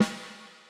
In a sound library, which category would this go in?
Music > Solo percussion